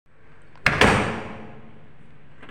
Sound effects > Objects / House appliances
Turning door knob closing sound effect. Recorded with Android mobile phone.
close, door, greeting, knob, lock, slam, turning